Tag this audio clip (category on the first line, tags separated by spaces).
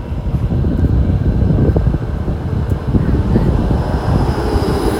Sound effects > Vehicles
Tampere traffic